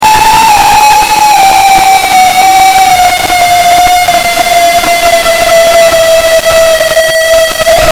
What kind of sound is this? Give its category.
Sound effects > Natural elements and explosions